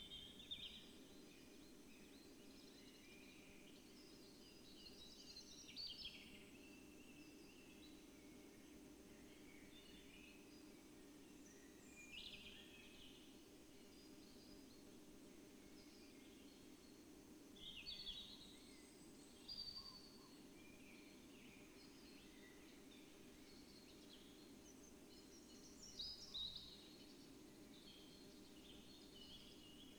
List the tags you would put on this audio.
Soundscapes > Nature
phenological-recording
raspberry-pi
natural-soundscape
modified-soundscape
field-recording
soundscape
nature
artistic-intervention
Dendrophone
sound-installation
alice-holt-forest
weather-data
data-to-sound

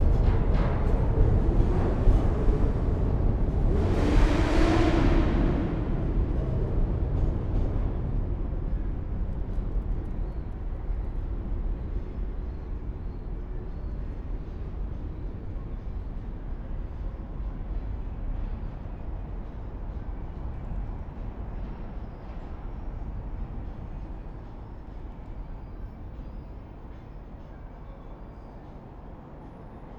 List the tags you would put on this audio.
Soundscapes > Urban
soundscape
bike
traffic
bridge
train
trainstation
ambience
trains
bikes

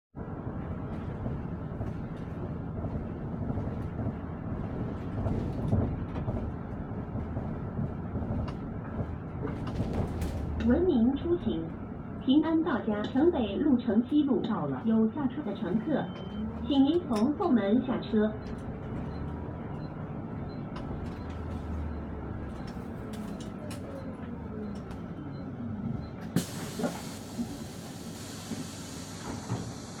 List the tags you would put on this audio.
Soundscapes > Urban
ambiance
ambience
bus

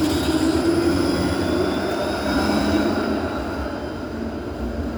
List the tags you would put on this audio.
Sound effects > Vehicles
Tram
Vehicle

